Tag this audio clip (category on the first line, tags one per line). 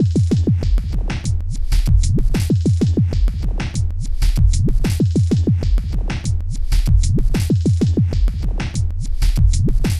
Instrument samples > Percussion
Alien
Ambient
Dark
Drum
Industrial
Loop
Loopable
Packs
Soundtrack
Underground
Weird